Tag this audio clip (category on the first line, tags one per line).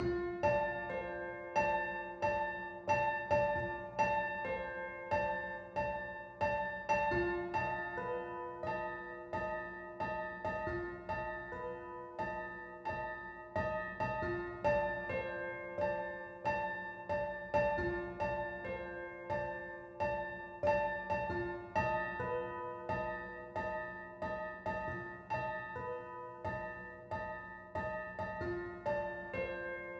Music > Solo percussion
old-piano
keys
music
1lovewav
mini-piano
melodic
sample